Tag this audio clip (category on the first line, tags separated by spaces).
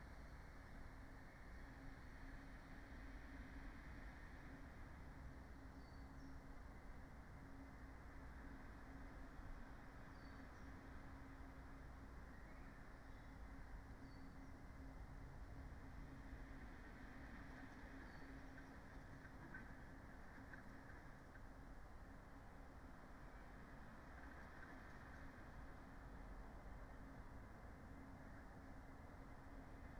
Soundscapes > Nature
modified-soundscape natural-soundscape alice-holt-forest artistic-intervention data-to-sound field-recording nature weather-data Dendrophone sound-installation raspberry-pi phenological-recording soundscape